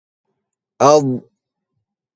Sound effects > Other
dhot-sisme
arabic, sound, vocal, voice